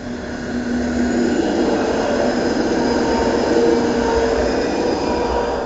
Soundscapes > Urban
Passing Tram 17
A sound of a tram passing by. The sound was recorded from Tampere, next to the tracks on the street. The sound was sampled in a slightly windy afternoon using a phone, Redmi Note 10 Pro. It has been recorded for a course project about sound classification.
city, trolley, field-recording, street, tram, outside, traffic, urban